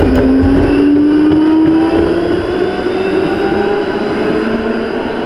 Sound effects > Vehicles
Tram00050924TramPassingSpeedingUp
Tram passing by with increasing speed after its stop. Recorded during the winter in an urban environment. Recorded at Tampere, Hervanta. The recording was done using the Rode VideoMic.
city, field-recording, tram, tramway, transportation, vehicle, winter